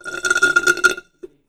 Sound effects > Objects / House appliances
Slurp sound effect. Sounds like what you'd expect a slurp sound effect to sound like. Recorded with Audacity by drinking from an almost empty can of Fanta, and lowering the volume of the original recording.